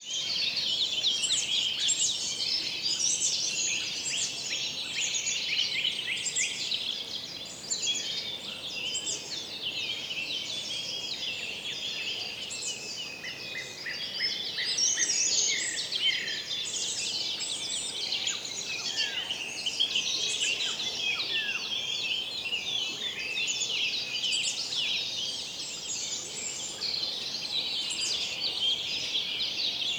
Soundscapes > Nature
Ambient soundscape of a Polish forest. Recorded in Poland, this track features various birdsong and natural background sounds typical for a forest environment. No human noise or mechanical sounds. Effects recorded from the field.